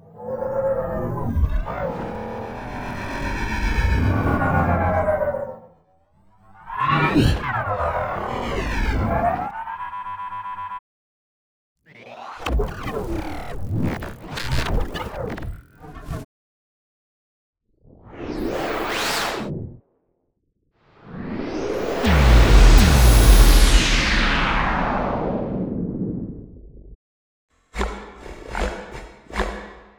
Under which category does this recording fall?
Sound effects > Electronic / Design